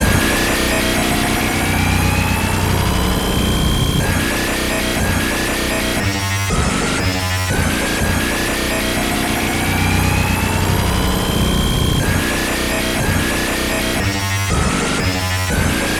Music > Other
A high-intensity mech-dubstep musical loop. Inspired by early 2010s mechanical bass music, this piece features complex rhythmic modulation, "talking" mid-range growls, and aggressive harmonic movement. It serves as a standalone musical phrase or a primary building block for heavy bass music.